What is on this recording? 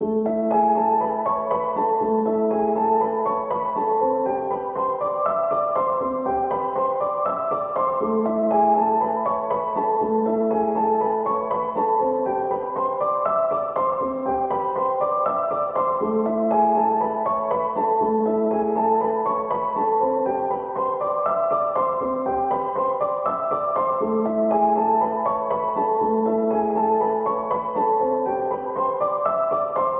Music > Solo instrument
Piano loops 154 efect 4 octave long loop 120 bpm
120, 120bpm, free, loop, music, piano, pianomusic, reverb, samples, simple, simplesamples